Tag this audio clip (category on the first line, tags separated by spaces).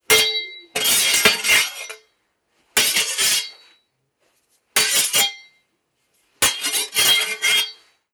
Sound effects > Other
shing game battle blade ring weapon fight medieval rubbing dagger metallic knight fantasy metal swords knife videogame foley impact clashing axe sword sfx clang scraping hit